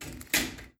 Sound effects > Objects / House appliances
A pet gate closing.